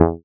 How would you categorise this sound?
Instrument samples > Synths / Electronic